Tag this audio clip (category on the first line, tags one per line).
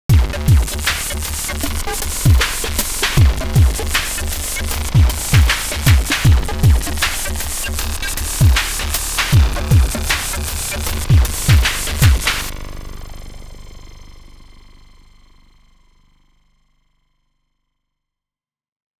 Music > Multiple instruments
bass
beat
dark
hip
hiphop
loop
melodic